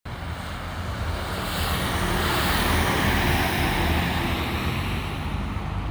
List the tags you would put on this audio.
Sound effects > Vehicles
bus
vehicle

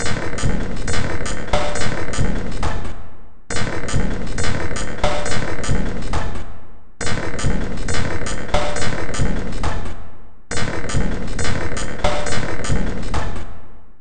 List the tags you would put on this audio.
Instrument samples > Percussion
Samples
Underground
Loopable
Packs
Drum

